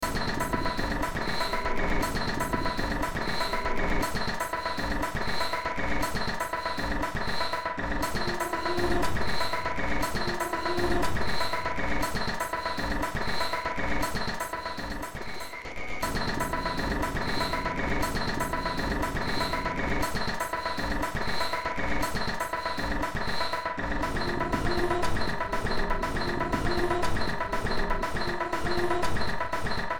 Music > Multiple instruments
Short Track #3216 (Industraumatic)
Ambient, Cyberpunk, Games, Horror, Industrial, Noise, Sci-fi, Soundtrack, Underground